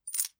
Sound effects > Other
Coin Clink Free
Several coins clinking together.